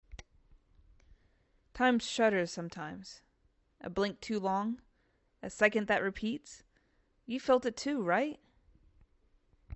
Speech > Solo speech
“Out of Sync” (sci-fi / unsettling)
A slightly paranoid, glitchy opener, ideal for eerie sci-fi or psychological horror.
mindscrew, Script, timeglitch